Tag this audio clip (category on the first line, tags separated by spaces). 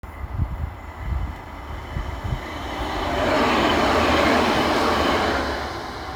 Soundscapes > Urban
Railway Field-recording